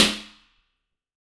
Soundscapes > Other
IR - Dual rec - Stereo Omni H2N (4m90 X 3m X 2m70) - 88cm from Window side
Recorded 2025 07 09. Trying to compare my Zoom H2N "surround" mode set in 2 channels. Versus my Superlux ECM999 which has a fair amount of self-noise. However a omni mic would probably be better. H2n was set at 2.5 gain. The balloon poped 50cm under the setup did clip. I trimmed the "impulse" off and left the reverb trail. No gain adjustment in post other than a fade out. Tascam FR-AV2 / Superlux ECM-999 has been matched gain wise, and same fade out. Both recordings are from the same time. the superlux being slightly above leaning from the the right side over the H2n.
balloon, bedroom, Convolution-Reverb, H2N, impulse-response, IR, pop, response, reverb, Zoom